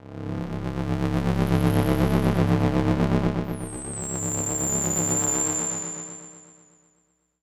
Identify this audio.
Sound effects > Experimental
Analog Bass, Sweeps, and FX-078
from a collection of analog synth samples recorded in Reaper using multiple vintage Analog synths alongside analog delay, further processing via Reaper